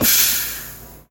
Sound effects > Other mechanisms, engines, machines
A steam train chuff.